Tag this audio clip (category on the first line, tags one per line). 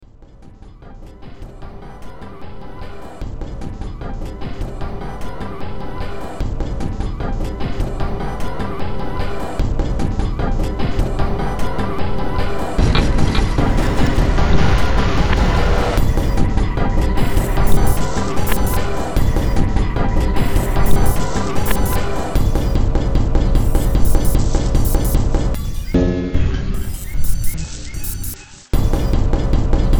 Music > Multiple instruments
Cyberpunk
Ambient
Noise
Soundtrack
Industrial
Sci-fi
Underground
Games
Horror